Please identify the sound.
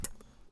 Sound effects > Objects / House appliances
Junkyard Foley and FX Percs (Metal, Clanks, Scrapes, Bangs, Scrap, and Machines) 5
Smash, Environment, FX, rattle, scrape, dumpster, Perc, trash, rubbish, Metal, tube, Atmosphere, Clang, Robotic, waste, SFX, Junkyard, Robot, dumping, Metallic, garbage, Bang, Foley, Ambience, Machine, Junk, Clank, Percussion, Dump, Bash